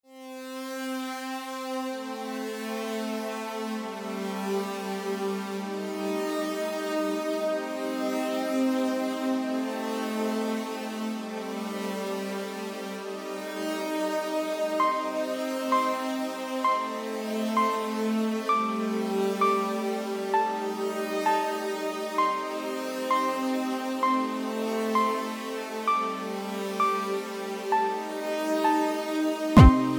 Music > Multiple instruments
Electronic music - Kocie wymiociny
80, cinematic, electro, film, movie, music, score, synthwave, trailer